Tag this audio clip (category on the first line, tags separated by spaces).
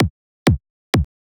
Instrument samples > Percussion
kick Punchy EDM Drum FutureBounce